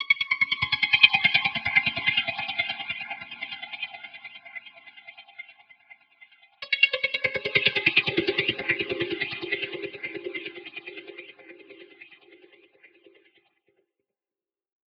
Instrument samples > Synths / Electronic
Synth Melody 01
audacity, 145bpm, goa, psy, lead, goatrance, psy-trance, trance, goa-trance, flstudio, psytrance